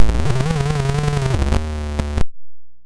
Sound effects > Electronic / Design
Optical Theremin 6 Osc dry-108
Bass, Dub, Handmadeelectronic, Noise, noisey, Optical, Robotic